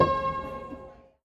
Music > Solo instrument
MUSCKeyd-Samsung Galaxy Smartphone, CU Grand Piano, Note 01 Nicholas Judy TDC
A grand piano note. Recorded at The Arc.
grand-piano, note, Phone-recording, sample